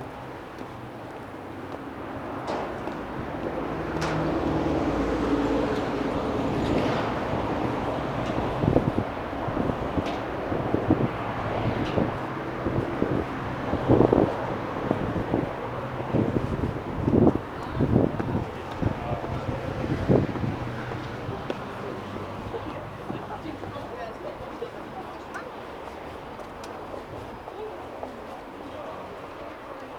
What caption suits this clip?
Soundscapes > Urban
City Walk
Old recording, made probably with phone, during one of my 2015's walks around Gdynia.
cars, city, people, street, traffic, walk